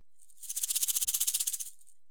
Instrument samples > Percussion
Dual shaker-013
sampling, recording, percusive